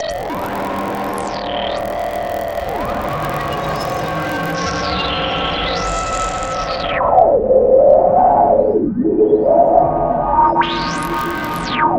Sound effects > Electronic / Design
Roil Down The Drain 8
drowning, dark-techno, noise, noise-ambient, vst, horror, sound-design, cinematic, scifi, mystery, dark-design, content-creator, PPG-Wave, dark-soundscapes, sci-fi, science-fiction